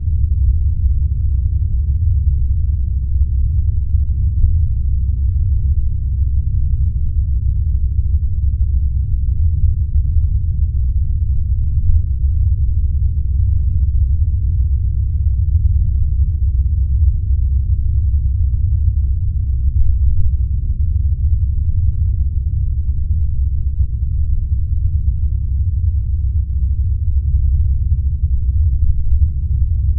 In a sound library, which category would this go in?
Soundscapes > Urban